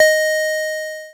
Instrument samples > Synths / Electronic
[BrazilFunk] Lead One-shot 2-#D Key
Synthed with phaseplant only.
Key, Pluck